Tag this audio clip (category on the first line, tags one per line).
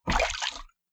Sound effects > Natural elements and explosions
liquid
splash
water